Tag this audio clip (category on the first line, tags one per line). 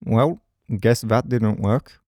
Speech > Solo speech
MKE-600
Adult
Hypercardioid
mid-20s
Single-mic-mono
MKE600
Tascam
Calm
well-guess-that-didnt-work
july
Voice-acting
2025
FR-AV2
Male
VA
Sennheiser
Shotgun-microphone
Shotgun-mic
Generic-lines